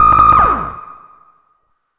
Instrument samples > Synths / Electronic
Benjolon 1 shot18
1 shot sound sampled from a modular synth. gear used: diy benjolin from kweiwen kit, synthesis technology e440 and e520, other control systems....... percussive modular synth hit. throw these in a sampler or a daw and GET IT
1SHOT, CHIRP, DONK, DRUM, HARSH, MODULAR, NOISE, SYNTH